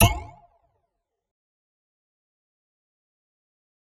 Sound effects > Experimental
Zero-G Racquet Hit 2
A failed attempt to make some other material led to the creation of these satisfying impact sounds. I imagined a blisteringly fast, zero-gravity sports game where athletes wield electric racquets/bats and hit floating spheres. (Or something else if you prefer.) Fun fact: The samples I put various through filters here were recordings of me playing a tiny kalimba.